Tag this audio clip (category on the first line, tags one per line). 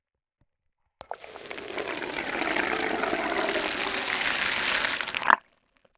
Sound effects > Objects / House appliances
FR-AV2
tap
Tascam